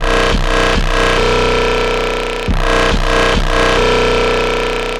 Sound effects > Electronic / Design
BUZZ SAW TWO
A buzz saw in audio form. Version two of two.